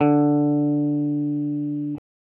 Instrument samples > String
Random guitar notes 001 D3 07
electric, electricguitar, guitar, stratocaster